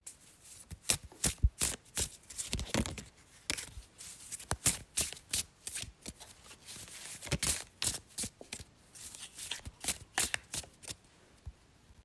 Sound effects > Objects / House appliances
kāršu maisīšana / card shuffling
item, closeup, recording, household